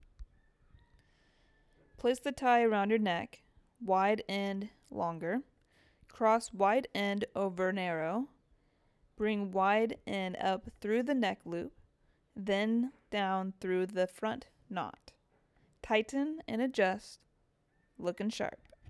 Speech > Solo speech
A simple and clear guide on tying a basic necktie knot. Great for formalwear tutorials, school projects, or style videos. Script: "Place the tie around your neck, wide end longer. Cross wide end over narrow. Bring wide end up through the neck loop, then down through the front knot. Tighten and adjust. Looking sharp!"